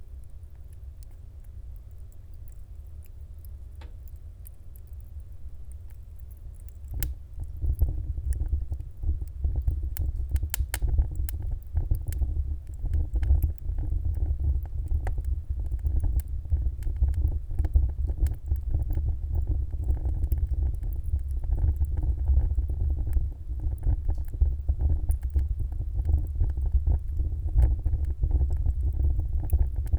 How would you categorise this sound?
Sound effects > Natural elements and explosions